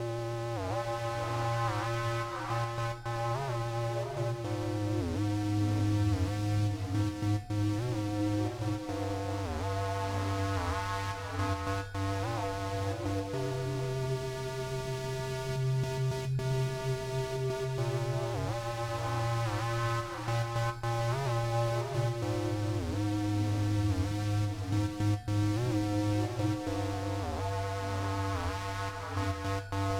Music > Solo instrument
a square synth with a bit of glide.